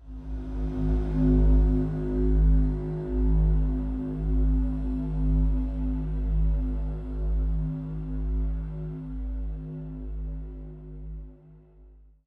Soundscapes > Synthetic / Artificial
The Pulsing Glow
Recorded from a didgeridoo. Slowed down the audio clip. Added reverb.
suspence, dark, tribal, ambience, rumble, drone, pad, soundscape, Didgeridoo, deep, atmosphere, sci-fi, ambient